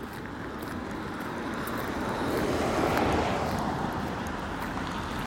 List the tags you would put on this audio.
Soundscapes > Urban
car
tampere
vehicle

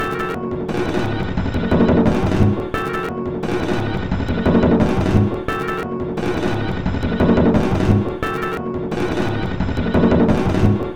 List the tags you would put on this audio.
Instrument samples > Percussion
Soundtrack
Underground
Samples
Loop
Loopable
Alien
Ambient
Dark

